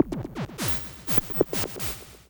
Sound effects > Experimental
Analog Bass, Sweeps, and FX-162
alien, analog, analogue, bass, basses, bassy, complex, dark, effect, electro, electronic, fx, korg, machine, mechanical, oneshot, pad, retro, robot, robotic, sample, sci-fi, scifi, sfx, snythesizer, sweep, synth, trippy, vintage, weird